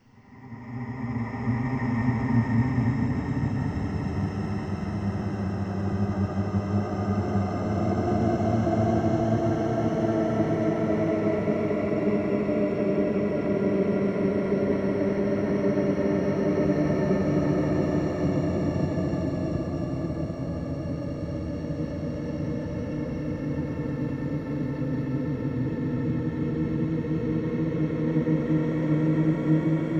Sound effects > Other
Spooky Ambience
Here's an eerie sounding recording of me making a dry inhaling sound into my Blue Yeti. I then paulstretched it and layered it for extra spookiness!
spooky, ambience